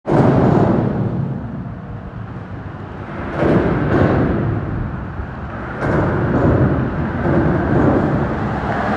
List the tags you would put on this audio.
Sound effects > Vehicles
field-recording passing road street traffic bridge car noise city cars ambience

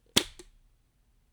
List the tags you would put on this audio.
Sound effects > Objects / House appliances
MP5 DJI Airsoft

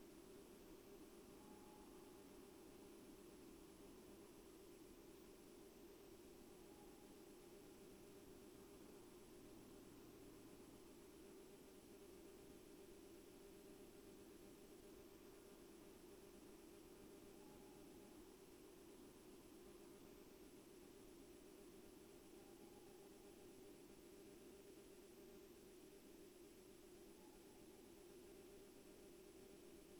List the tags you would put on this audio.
Soundscapes > Nature
soundscape modified-soundscape artistic-intervention data-to-sound field-recording sound-installation nature alice-holt-forest weather-data raspberry-pi natural-soundscape Dendrophone phenological-recording

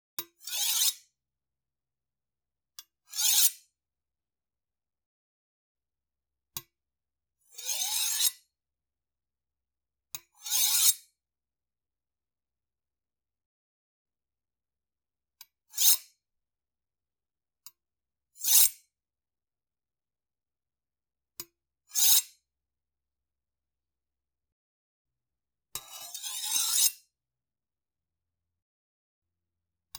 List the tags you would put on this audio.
Sound effects > Objects / House appliances
carving-knife cleaverknife cleaver sword fencing wepaons fate weapon bread-knife carving scraping medieval sharpening kung-fu scrape steel sharpen martialarts slide knife heavensfeel blade metal

